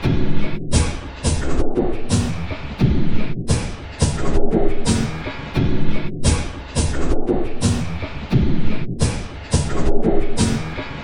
Instrument samples > Percussion
This 174bpm Drum Loop is good for composing Industrial/Electronic/Ambient songs or using as soundtrack to a sci-fi/suspense/horror indie game or short film.
Alien, Ambient, Dark, Drum, Industrial, Loop, Loopable, Packs, Samples, Soundtrack, Underground, Weird